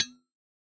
Sound effects > Objects / House appliances
Solid coffee thermos-015
percusive, sampling